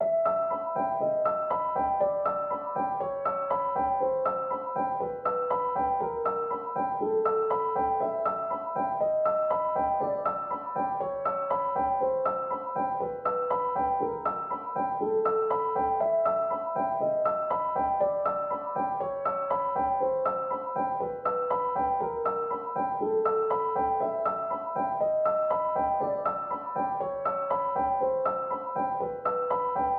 Music > Solo instrument
Piano loops 188 octave up long loop 120 bpm
120, 120bpm, free, loop, music, piano, pianomusic, reverb, samples, simple, simplesamples